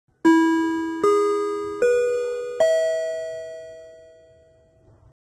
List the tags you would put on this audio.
Sound effects > Electronic / Design
ireland amplifier bell Pa Inter-m irishschool school Schoolbell

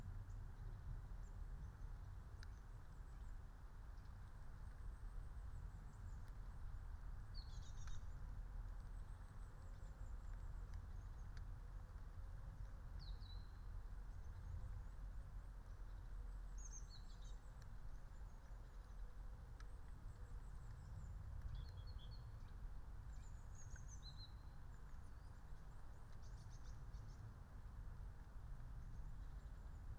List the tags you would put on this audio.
Soundscapes > Nature
natural-soundscape meadow raspberry-pi nature field-recording soundscape phenological-recording alice-holt-forest

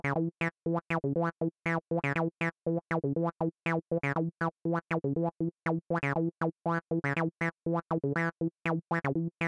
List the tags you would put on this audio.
Music > Solo instrument
303 Acid electronic hardware house Recording Roland synth TB-03 techno

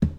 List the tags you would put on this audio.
Sound effects > Objects / House appliances
clang liquid bucket cleaning handle slam shake clatter water drop tip metal container pour kitchen hollow household debris scoop foley fill lid tool plastic

